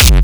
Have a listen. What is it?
Instrument samples > Percussion
BrazilFunk Kick 16 Processed-5
A easy kick made with 707 kick from flstudio original sample pack, used Waveshaper maxium output to make a crispy punch. Then I layered Grv kick 13 from flstudio original sample pack too. Easily add some OTT and Waveshaper to make it fat. Processed with ZL EQ.
Brazilian, BrazilFunk, BrazilianFunk, Distorted, Kick